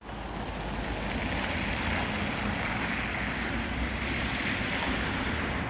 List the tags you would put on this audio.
Soundscapes > Urban
vehicle
car
traffic

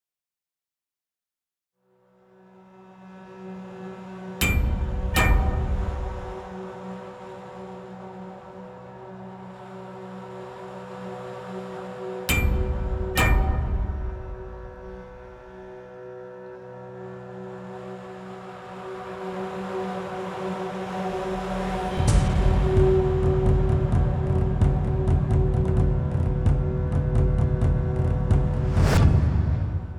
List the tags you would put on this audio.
Music > Multiple instruments
banging
drums
exciting
thunderous
drones
percussive
hammered-metal
musical-intro
music

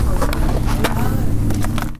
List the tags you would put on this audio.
Sound effects > Objects / House appliances
Metal
Junk
dumping
Junkyard
Bash
Machine
Foley
Bang
Dump
SFX
rubbish
FX
Ambience
Metallic
tube
scrape
Smash
Percussion
rattle
Clang
waste
Atmosphere
Clank
Robotic
trash
Robot
Perc
Environment
garbage
dumpster

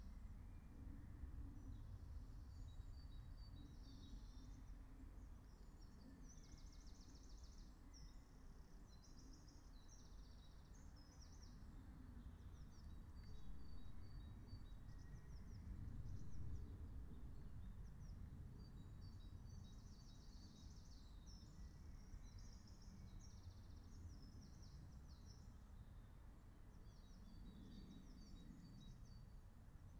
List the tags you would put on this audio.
Soundscapes > Nature
raspberry-pi
meadow
field-recording
natural-soundscape
soundscape
nature
phenological-recording
alice-holt-forest

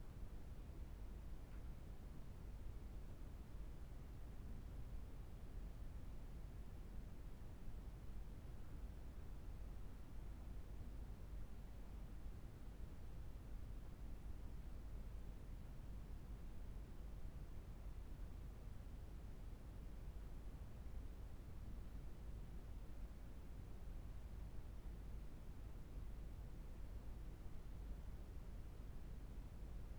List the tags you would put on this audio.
Soundscapes > Indoors
Continuous
Tone

